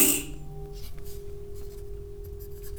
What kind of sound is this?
Sound effects > Other mechanisms, engines, machines
Woodshop Foley-003
sfx, thud, metal, bang, sound, rustle, knock, tools, oneshot, foley, tink, shop, bop, little, percussion, strike, boom, fx, pop, bam, wood, crackle, perc